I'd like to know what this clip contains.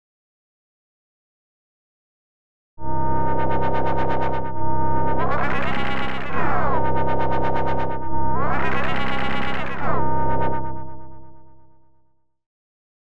Sound effects > Electronic / Design
Sci-Fi Beam Device - Wobble
A beam sound I accidentally made using loopback feedback from Reason to Reaper. Sounds like a beam weapon charging up or something. Used in a few of my other sounds.
device, energy, beam, wobble, ray, space, nuclear, charge, weapon, laser, particle, sci-fi, tachyon